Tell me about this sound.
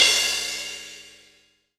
Instrument samples > Percussion
crash XWR 4
Old crashfiles low-pitched, merged and shrunk in length.
Sabian, multicrash, Zultan, crash, Istanbul, Zildjian, clang, crack, China, Paiste, spock, sinocrash, cymbal, sinocymbal, Stagg, Soultone, low-pitched, multi-China, metallic, crunch, clash, polycrash, Avedis, shimmer, Meinl, metal, smash, bang